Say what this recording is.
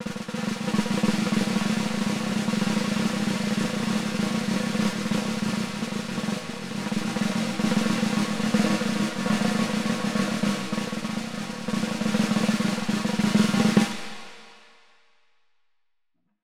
Music > Solo percussion
snare Processed - roll consistent - 14 by 6.5 inch Brass Ludwig
beat, brass, crack, drumkit, drums, flam, fx, hit, hits, oneshot, perc, percussion, processed, realdrum, realdrums, reverb, rimshot, rimshots, roll, sfx, snareroll, snares